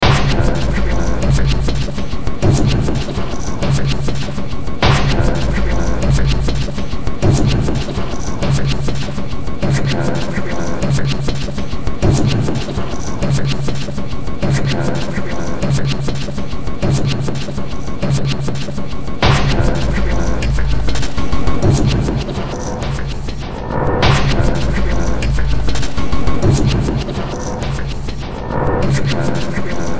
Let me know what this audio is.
Music > Multiple instruments
Demo Track #3517 (Industraumatic)
Track taken from the Industraumatic Project.
Games, Soundtrack